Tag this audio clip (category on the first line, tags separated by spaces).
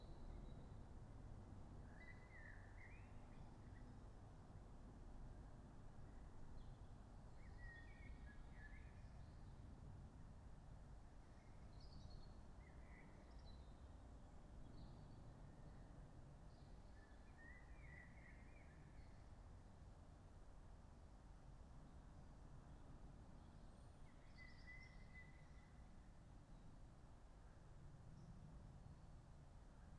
Soundscapes > Nature
alice-holt-forest artistic-intervention data-to-sound field-recording modified-soundscape natural-soundscape phenological-recording raspberry-pi soundscape weather-data